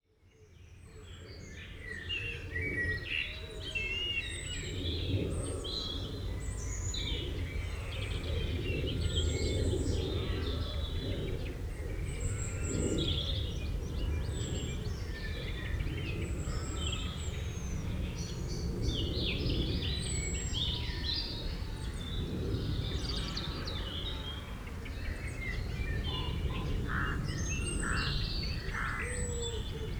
Soundscapes > Nature
A recording in a garden, in the evening at a local B&B.
animals, birds, cumbria, district, farm, filed, garden, lake, recording